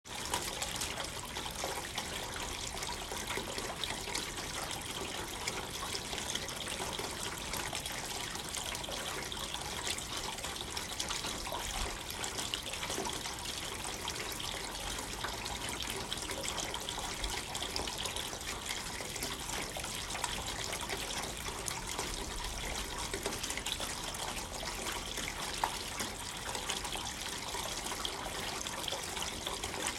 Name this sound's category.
Sound effects > Natural elements and explosions